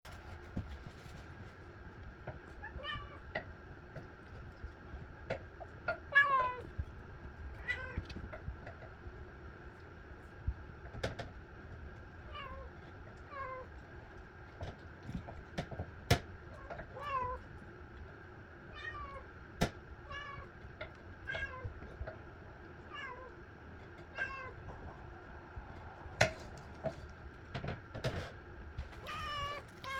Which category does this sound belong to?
Sound effects > Animals